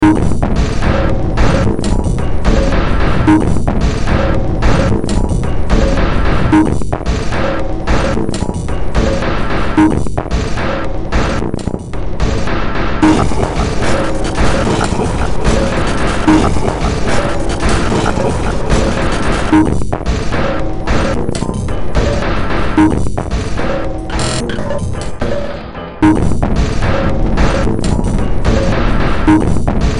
Music > Multiple instruments
Short Track #3884 (Industraumatic)
Underground, Games, Ambient, Cyberpunk, Sci-fi, Horror, Industrial, Noise, Soundtrack